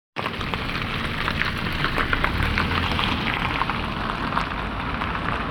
Sound effects > Vehicles
kia ceed
Tampere, field-recording